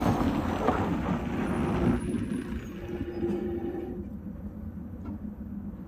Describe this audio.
Sound effects > Vehicles
final bus 34
hervanta, finland